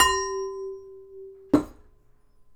Sound effects > Other mechanisms, engines, machines
metal shop foley -060
bam, bang, boom, bop, crackle, foley, fx, knock, little, metal, oneshot, perc, percussion, pop, rustle, sfx, shop, sound, strike, thud, tink, tools, wood